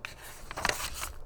Sound effects > Objects / House appliances
OBJBook-Blue Snowball Microphone Book, Page, Turn 04 Nicholas Judy TDC
Turning a page of a book.
book, turn, foley, Blue-Snowball, page, Blue-brand